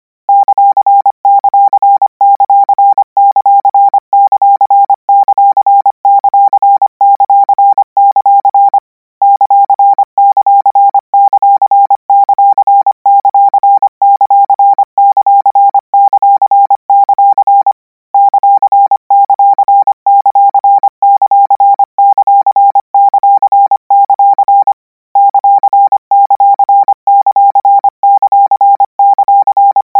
Sound effects > Electronic / Design
Koch 52 ; - 200 N 25WPM 800Hz 90
Practice hear symbol ';' use Koch method (practice each letter, symbol, letter separate than combine), 200 word random length, 25 word/minute, 800 Hz, 90% volume.